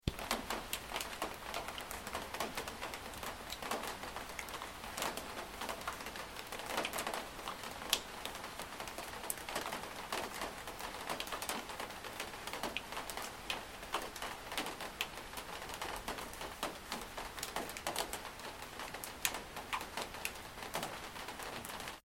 Sound effects > Other
It's raining softly outside and the drops hit the window lightly.